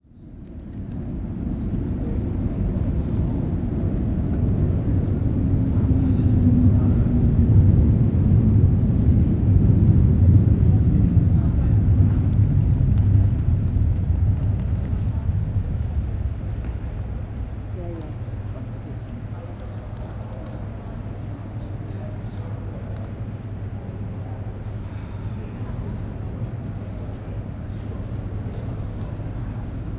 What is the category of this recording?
Soundscapes > Indoors